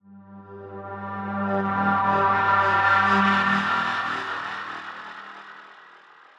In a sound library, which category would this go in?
Sound effects > Electronic / Design